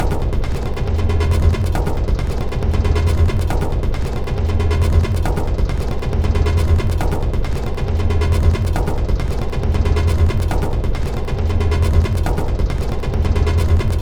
Instrument samples > Percussion
This 137bpm Drum Loop is good for composing Industrial/Electronic/Ambient songs or using as soundtrack to a sci-fi/suspense/horror indie game or short film.
Packs, Samples, Soundtrack, Ambient, Dark, Loop, Industrial, Weird, Alien, Drum, Loopable, Underground